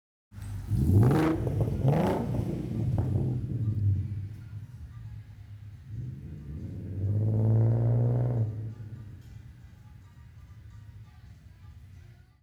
Soundscapes > Urban
Muscle Car Throttle Blips and Take Off
Throttle blips and take-off was recorded with a Pixel 9 using the Pfitzinger Voice Design Field Recorder app. This was recorded late in the evening near a bar as people were leaving. I was just recording some ambient traffic. Someone had a car they were proud of and wanted to let everyone hear it. I didn't catch the make of the car.
racing, car, field-recording, motor, auto, passing